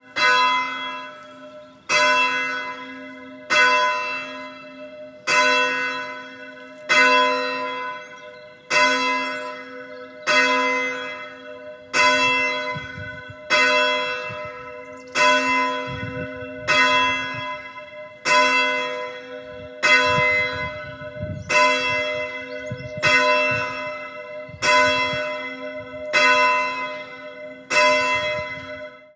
Soundscapes > Other
St Peters Church, Kinver - Distant Tolling Bell
Bells tolling in a village church, England, UK.
church, england